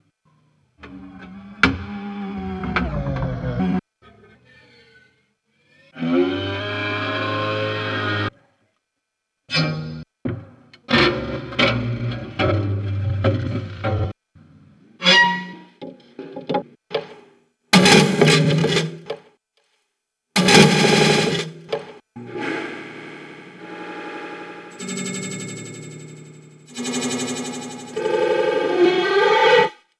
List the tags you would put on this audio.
Sound effects > Experimental
acousmatic
bowed-cymbal
comb-filter
extended-technique
musique-concrete
objet-sonore
slicing
tape-manipulation

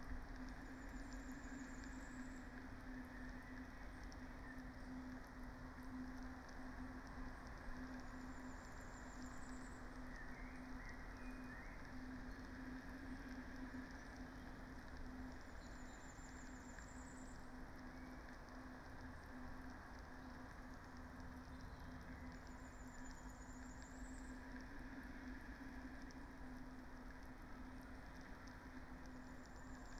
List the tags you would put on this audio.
Soundscapes > Nature
field-recording
natural-soundscape
raspberry-pi
sound-installation
weather-data

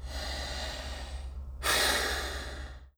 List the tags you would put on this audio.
Sound effects > Human sounds and actions
Blue-brand
Blue-Snowball
breath
breathe
breathing
deep
exhale
human
inhale

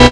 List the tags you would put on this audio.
Instrument samples > Synths / Electronic
fm-synthesis
additive-synthesis
bass

